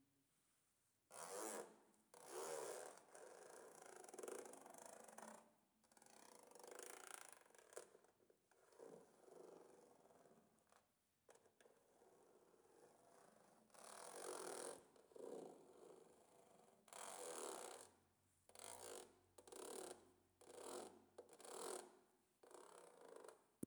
Sound effects > Experimental
OBJECTMisc Tv back sliding nails continuous sounds tv silence NMRV FSC1
Scratchng back of tv rocky sound like hard plastic
Scratching, Sliding, tv